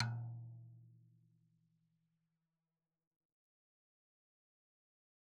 Music > Solo percussion
Med-low Tom - Oneshot 56 12 inch Sonor Force 3007 Maple Rack
realdrum, wood, loop, Tom, percussion, toms, maple, real, drum, med-tom, tomdrum, flam, drums, beat, oneshot, recording, perc, acoustic, roll, Medium-Tom, kit, drumkit, quality